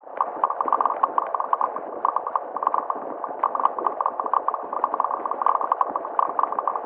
Sound effects > Objects / House appliances
Boiling Water3
Boiling, Water, Bubble